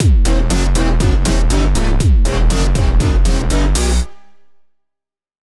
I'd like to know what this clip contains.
Music > Multiple instruments
120 BPM - just a random loop I made in FL Studio, might be useful for somebody maybe as a boss fight scene. If you use it I would like you to share your final creation with me if that is possible.